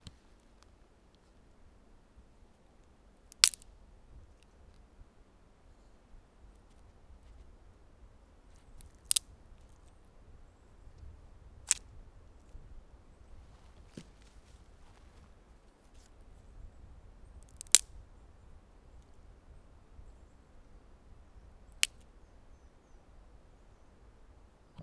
Sound effects > Human sounds and actions
Snapping and breaking of small dry twigs and branches.
forest, breaking, snap, foley, crackle, wood, branch, twigs